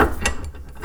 Sound effects > Other mechanisms, engines, machines
metal shop foley -007
bam, bang, boom, bop, crackle, foley, fx, knock, little, metal, oneshot, perc, percussion, pop, rustle, sfx, shop, sound, strike, thud, tink, tools, wood